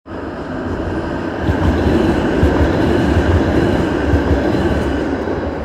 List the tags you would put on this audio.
Sound effects > Vehicles
public-transport city

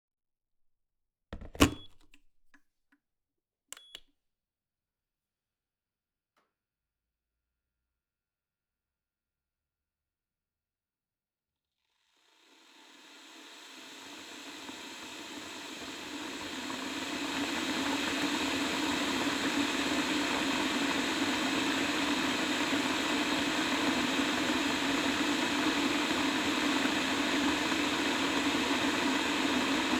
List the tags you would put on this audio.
Sound effects > Objects / House appliances
boil boiling bubble bubbling kettle kitchen sfx

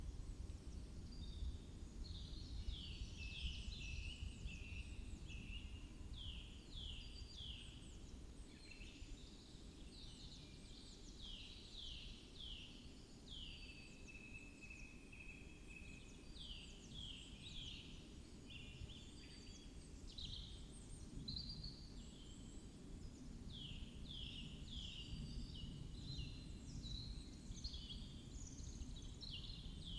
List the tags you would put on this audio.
Soundscapes > Nature
soundscape data-to-sound field-recording modified-soundscape alice-holt-forest Dendrophone sound-installation

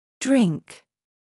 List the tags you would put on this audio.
Speech > Solo speech
word english pronunciation voice

